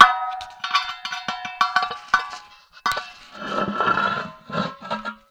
Sound effects > Experimental
contact mic in metal thermos, handling4
Tapping on and moving around an empty thermos with a contact microphone inside